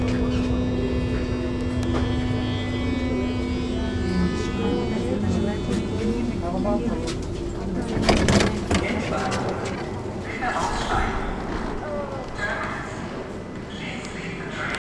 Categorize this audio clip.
Soundscapes > Urban